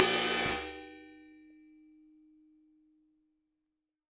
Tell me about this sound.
Music > Solo instrument
Cymbal oneshot from a collection of cymbal drum percussion pack recorded with Sure microphones and reaper. Processed with Izotope RX Spectral denoise